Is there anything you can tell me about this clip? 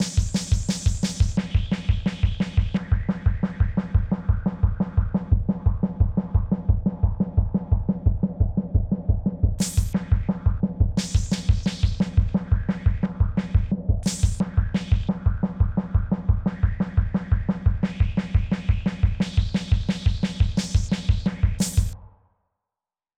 Instrument samples > Percussion
Simple Bass Drum and Snare Pattern with Weirdness Added 009
Experimental-Production, Experiments-on-Drum-Beats, Experiments-on-Drum-Patterns, Four-Over-Four-Pattern, Fun, FX-Drum, FX-Laden, Glitchy, Interesting-Results, Noisy, Silly